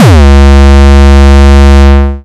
Instrument samples > Percussion
Garbber Kick 3 C#
Synthed with 3xOsc olny. Processed with Camel crusher, Fruity fast dist, Waveshaper.
Oldschool, Kick, Garbber, Hardstyle